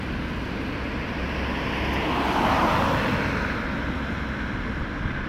Sound effects > Vehicles
Car 2025-10-27 klo 20.13.00
Sound recording of a car passing by. Recording done next to Hervannan valtaväylä, Hervanta, Finland. Sound recorded with OnePlus 13 phone. Sound was recorded to be used as data for a binary sound classifier (classifying between a tram and a car).
Finland, Car, Field-recording